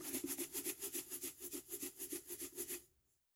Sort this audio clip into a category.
Sound effects > Objects / House appliances